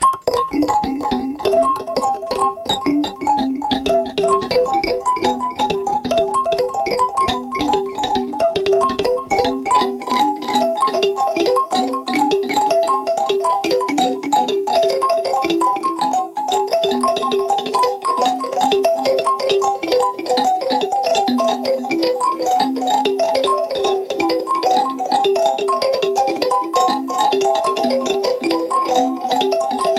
Music > Multiple instruments
Atonal Bouncing Texture #004
Experiments on atonal melodies that can be used as background textures. AI Software: Suno Prompt: atonal, bouncing, low tones, experimental, bells, Mouth Blip Blops, echo, delays, reverb, weird, surprising